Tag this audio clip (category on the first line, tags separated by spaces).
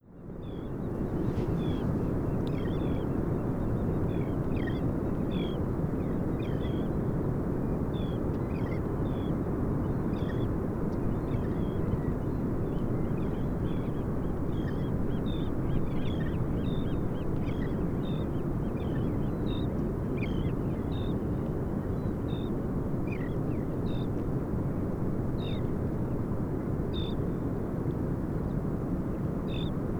Soundscapes > Nature
field-recording estuary shore-birds